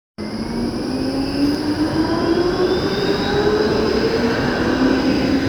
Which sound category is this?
Soundscapes > Urban